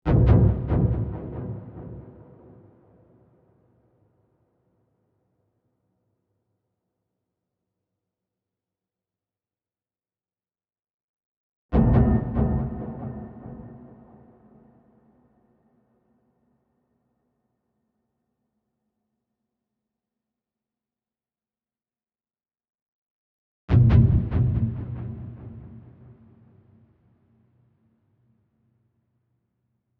Sound effects > Experimental
DSGNImpt Techno Industrial Impacts EM
Several techno-industrial impacts. It was obtained by some experiments and processing. I hope it will be useful for you.
artificial, cinematic, dark, drum, drums, effect, electronic, experimental, hit, impact, industrial, kick, loop, music, percussion, sample, sfx, sound, sound-design, techno, transition